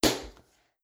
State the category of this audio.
Sound effects > Objects / House appliances